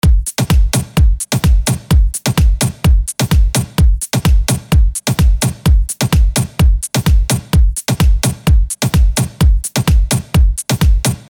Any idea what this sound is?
Music > Solo percussion
Ableton Live. VST .Nexus,........Drums 128 Bpm Free Music Slap House Dance EDM Loop Electro Clap Drums Kick Drum Snare Bass Dance Club Psytrance Drumroll Trance Sample .
Kick, Loop, Slap, Dance, 128, House, Drums, Bass, Music, Clap, Bpm, Free, Snare, Drum, Electro, EDM